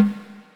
Music > Solo percussion
Snare Processed - Oneshot 138 - 14 by 6.5 inch Brass Ludwig
acoustic, beat, brass, crack, drum, drumkit, drums, flam, fx, hit, hits, kit, ludwig, oneshot, perc, percussion, processed, realdrum, realdrums, reverb, rim, rimshot, rimshots, roll, sfx, snare, snaredrum, snareroll, snares